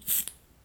Sound effects > Objects / House appliances
Spraying a 300ml lighter fluid bottle while attempting to figure out how to refill a lighter, recorded with a Zoom H2n, MS mode. Normalized in Audacity.